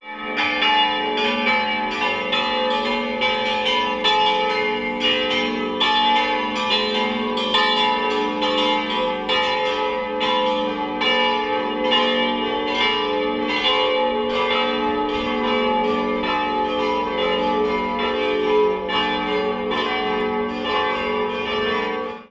Soundscapes > Urban
Recorded in Kotor old town by phone, recording features the resonant chimes of Kotor’s historic church bells.